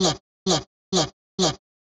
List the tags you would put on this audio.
Speech > Solo speech
BrazilFunk FX One-shot Vocal

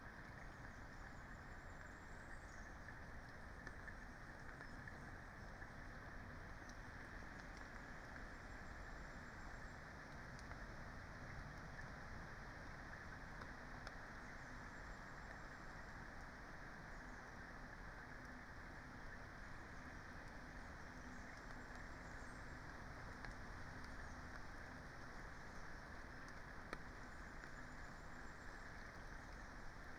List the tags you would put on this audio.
Soundscapes > Nature
data-to-sound sound-installation natural-soundscape weather-data soundscape field-recording raspberry-pi nature modified-soundscape alice-holt-forest artistic-intervention phenological-recording Dendrophone

